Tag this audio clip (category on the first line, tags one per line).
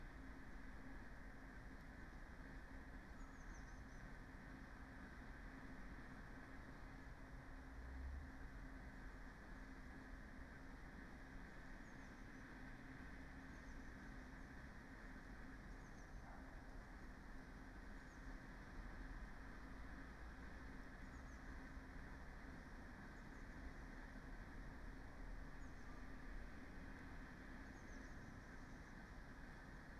Soundscapes > Nature
phenological-recording
sound-installation
data-to-sound
modified-soundscape
raspberry-pi
nature
field-recording
Dendrophone
artistic-intervention